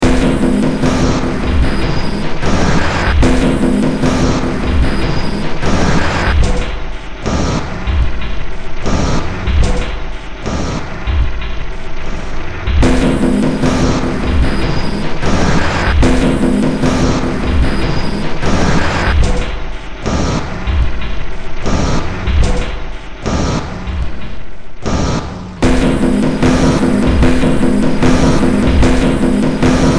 Music > Multiple instruments
Short Track #3661 (Industraumatic)
Ambient, Cyberpunk, Games, Horror, Industrial, Noise, Sci-fi, Soundtrack, Underground